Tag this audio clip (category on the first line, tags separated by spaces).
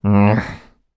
Speech > Solo speech
Male singletake FR-AV2 pain Voice-acting oneshot Man Hurt talk Neumann Single-take NPC Human Tascam Mid-20s Video-game voice dialogue U67 Vocal